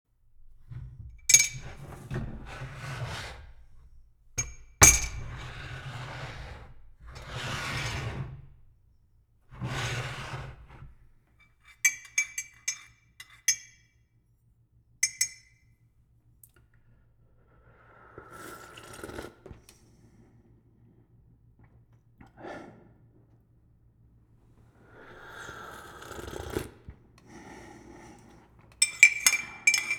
Sound effects > Objects / House appliances
Moving and Handling Tea Cup
A tea cup and doing things with it.
cup, mug, slide, spoon, tea